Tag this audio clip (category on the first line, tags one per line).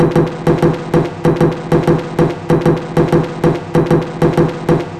Instrument samples > Percussion
Industrial
Drum
Soundtrack
Loopable
Alien
Samples
Ambient
Dark
Weird
Loop
Underground
Packs